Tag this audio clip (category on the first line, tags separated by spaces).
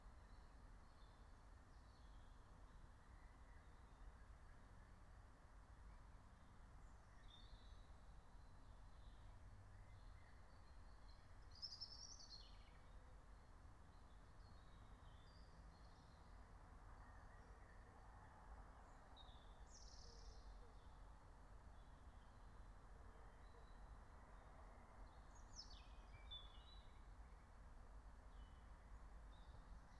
Soundscapes > Nature
alice-holt-forest meadow nature phenological-recording